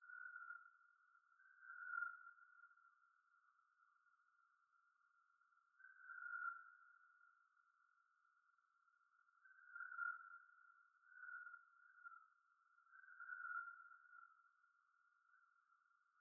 Sound effects > Animals
Sparrows slowed down to 25% speed, then manipulated with SpecOps VST from Unfiltered Audio. Frequency shifted upwards. Also used a passband filter before and another after the SpecOps effect to really focus only on the frequencies of interest and eliminate unwanted ambient noise.